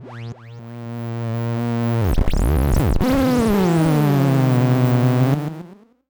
Sound effects > Experimental
Analog Bass, Sweeps, and FX-096
complex, snythesizer, korg, sweep, dark, analog, sci-fi, sfx, robotic, weird, oneshot, bassy, fx, electro, retro, electronic, sample, trippy, alien, mechanical, robot, scifi, basses, synth, vintage, analogue, machine, effect, pad, bass